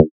Instrument samples > Synths / Electronic
DUCKPLUCK 8 Eb
fm-synthesis, additive-synthesis, bass